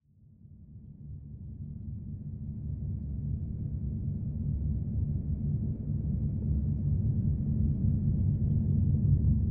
Sound effects > Other
Space Ship (Coming into view)
A sound I made using Audacity!